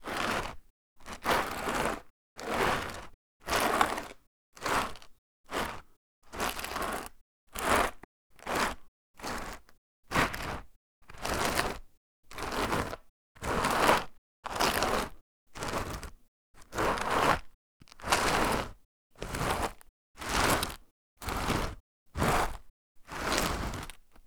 Sound effects > Objects / House appliances
Sliding cork on concrete
A sheet of cork sliding on concrete